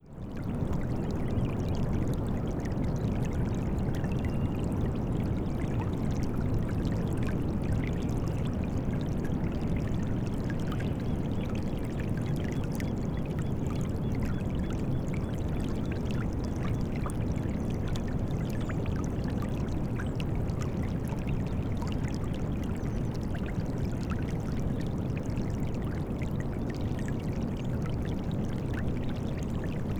Soundscapes > Nature
flood tide 2 - baywood - 12.25
The sound of a stream formed when the tide rises enough to break over the small hill between the marsh plants and the sand, filling up a temporary pond.
beach, field-recording, stream, water